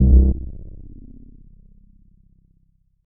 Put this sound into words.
Instrument samples > Synths / Electronic
syntbas0007 C-kr
VSTi Elektrostudio (Model Mini+Micromoon+Model Pro)
vst, vsti